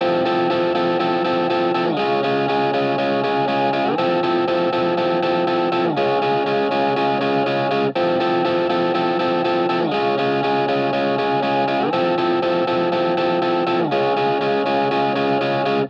Music > Solo instrument
Guitar loops 124 02 verison 02 120.8 bpm
bpm, electric, electricguitar, music, samples, simplesamples